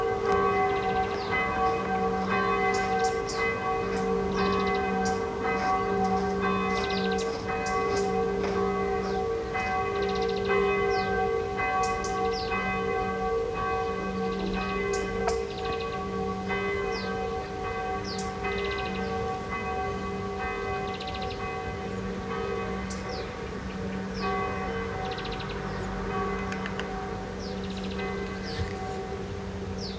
Soundscapes > Other
AMB-Morning,Birds,ChurchBell-2025-08-02 07.31.28
Morning ambience with Church bell recorded in Ruille Sur Loir, France. Recorded on iPhone 14 pro internal microphone.